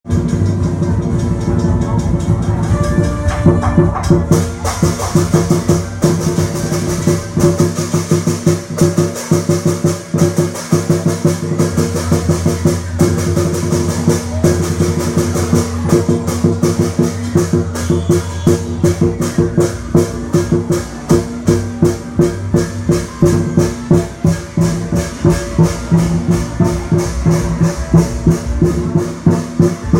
Music > Multiple instruments
Nhạc Lễ Đám Ma - Música De Funeral
Music for funeral for Nguyễn Văn Thành. Record use iPhone 7 Plus smart phone 2025.09.09 07:42
funeral, music, street-music